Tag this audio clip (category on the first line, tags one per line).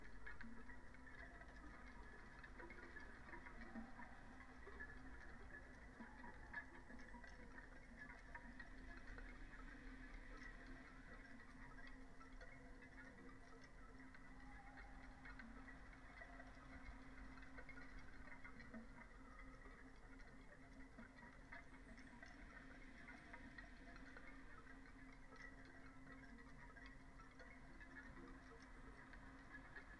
Soundscapes > Nature
phenological-recording
soundscape
weather-data
sound-installation
Dendrophone
natural-soundscape
nature
data-to-sound
modified-soundscape
alice-holt-forest
field-recording
raspberry-pi
artistic-intervention